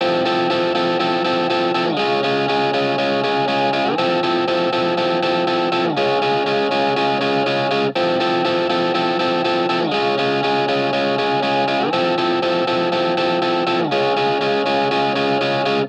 Music > Solo instrument
Guitar loops 124 01 verison 01 120.8 bpm
Otherwise, it is well usable up to 4/4 120.8 bpm.
electric, guitar, loop, music, reverb, samples, simple